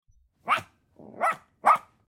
Sound effects > Animals
Pug Barking
barking, dog